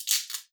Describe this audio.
Instrument samples > Percussion
Cellotape Percussion One Shot7
adhesive, ambient, cellotape, cinematic, drumoneshots, experimentalpercussion, foundsound, IDM, layeringsounds, lofi, oneshot, organi, percussion, sounddesign, tapepercussion, tapesounds, texture, uniquepercussion